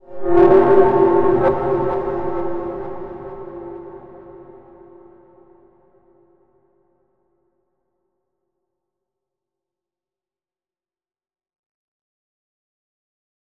Sound effects > Electronic / Design
Heavily edited and processed foley samples originated from an odd source - scratching dish plates together!